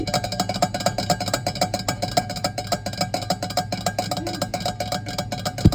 Sound effects > Human sounds and actions
Tapping waterbottle sound